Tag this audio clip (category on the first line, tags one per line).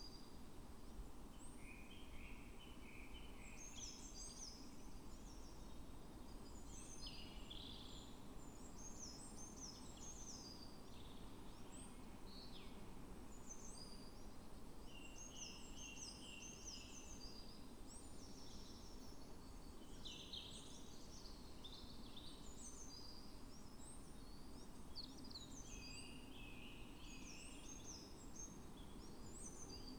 Soundscapes > Nature
sound-installation
natural-soundscape
phenological-recording
alice-holt-forest
weather-data
data-to-sound
nature
field-recording
raspberry-pi
Dendrophone
artistic-intervention
soundscape
modified-soundscape